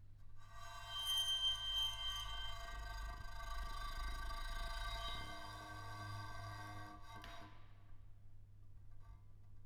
Sound effects > Other
Bowing metal newspaper holder with cello bow 1
Bowing the newspaper holder outside our apartment door. It's very resonant and creepy.
atmospheric, bow, eerie, effect, fx, horror, metal, scary